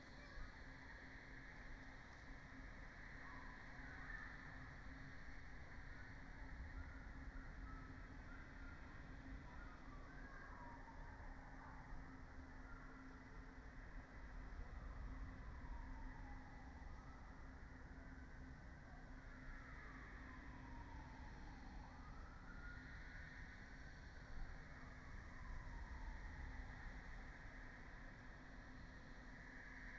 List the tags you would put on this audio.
Soundscapes > Nature
Dendrophone
modified-soundscape
phenological-recording

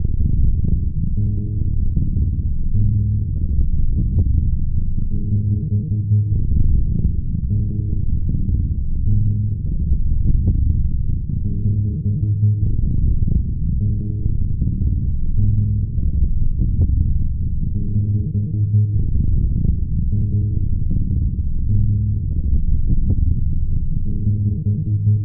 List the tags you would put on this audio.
Soundscapes > Synthetic / Artificial
Alien
Ambient
Dark
Drum
Industrial
Loop
Loopable
Packs
Samples
Soundtrack
Underground
Weird

